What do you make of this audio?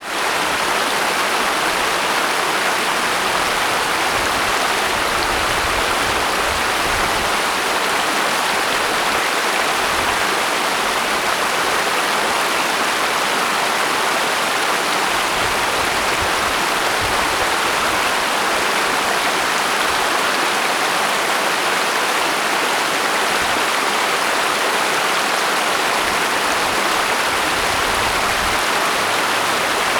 Soundscapes > Nature
WATRFall Urban Creek morning after rain
Urban creek flowing over rocks after a night of rain. Creek flowing to the left, mic pointed across and around 30 degrees to the left. It was a windy day so applied a HP Eq in iZotopeRX to remove noise and wind.